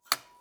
Sound effects > Objects / House appliances
Coin Foley 2
change, coin, perc, jostle, tap, percusion, foley, fx, jingle, coins, sfx